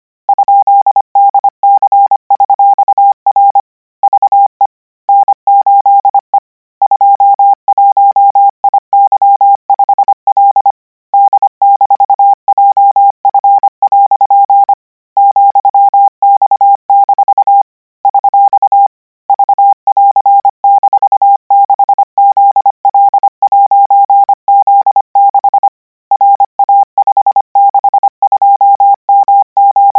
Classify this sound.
Sound effects > Electronic / Design